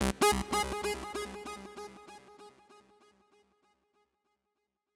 Sound effects > Electronic / Design
Psytrance One Shot 07
145bpm, audacity, flstudio, goa, goa-trance, goatrance, lead, nexus2, psy, psy-trance, psytrance, trance